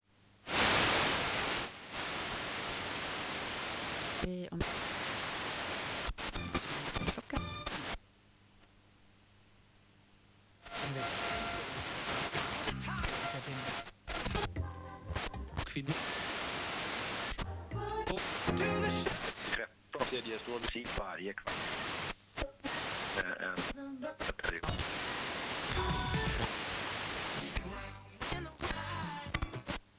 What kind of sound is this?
Sound effects > Objects / House appliances
Radio static 2
Radio static that was recorded sometime in 2022 using a Zoom H1 plugged into the aux output of a Tivoli analog radio.
am, fm, frequency, radio